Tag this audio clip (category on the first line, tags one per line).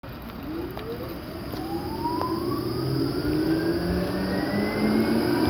Soundscapes > Urban
rail
tram
tramway